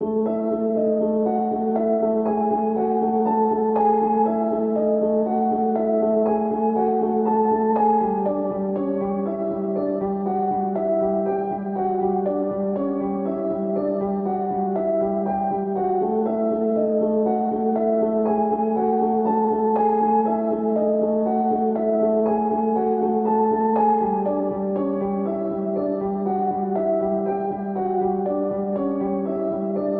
Music > Solo instrument
Piano loops 097 efect 4 octave long loop 120 bpm
120, 120bpm, free, loop, music, piano, pianomusic, reverb, samples, simple, simplesamples